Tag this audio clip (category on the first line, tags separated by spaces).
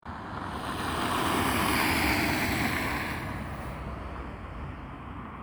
Sound effects > Vehicles
car engine vehicle